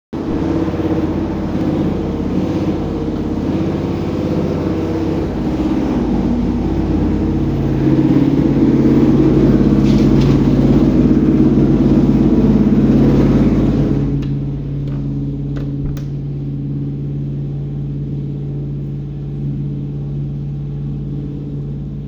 Sound effects > Other mechanisms, engines, machines
20250511 1622 waching machine noise phone microphone
waching machine noise